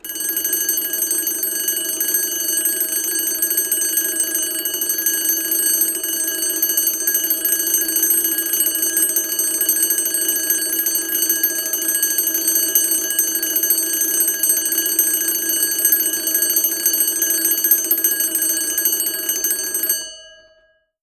Sound effects > Objects / House appliances
A large alarm clock ringing.